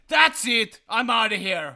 Speech > Solo speech
That's it, I'm out of here
argument, human, mad